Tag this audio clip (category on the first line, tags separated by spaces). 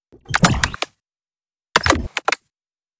Sound effects > Electronic / Design
click clicks effect electronic laser machine mech mechanical mechanics scifi sfx synthetic weapon weaponry